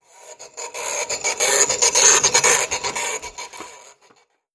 Sound effects > Objects / House appliances
A chatter telephone passing by.